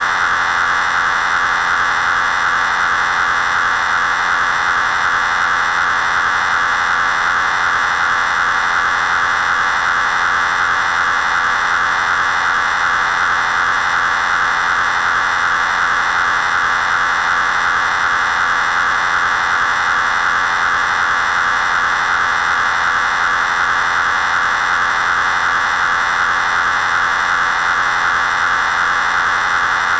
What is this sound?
Sound effects > Other mechanisms, engines, machines
IDM Atmosphare15(E note )
Hi ! That's not recording sound :) I synth it with phasephant!